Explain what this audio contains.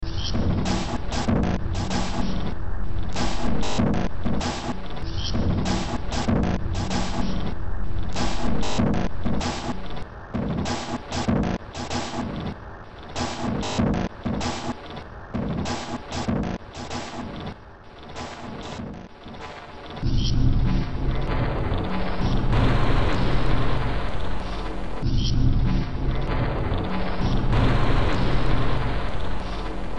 Music > Multiple instruments
Demo Track #3508 (Industraumatic)
Games, Horror, Noise, Cyberpunk, Underground, Soundtrack, Industrial, Ambient, Sci-fi